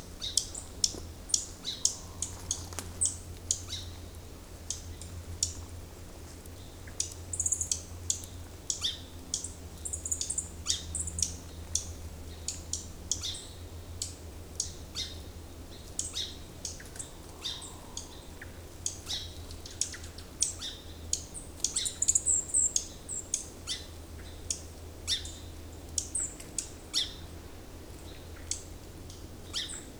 Soundscapes > Nature
Pender island birds in a forest near a dam and lake
Birds recorded in Pender island next to a lake
birds, dam, trees